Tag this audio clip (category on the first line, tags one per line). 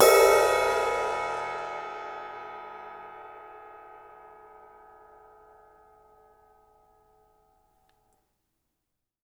Music > Solo instrument
15inch
Crash
Custom
Cymbal
Cymbals
Drum
Drums
Kit
Metal
Oneshot
Perc
Percussion
Sabian